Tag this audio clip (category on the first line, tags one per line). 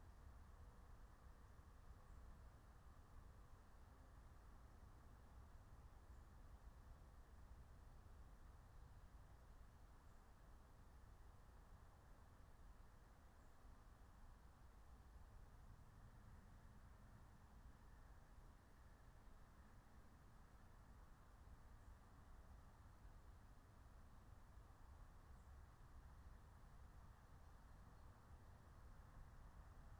Soundscapes > Nature
phenological-recording
meadow
nature
raspberry-pi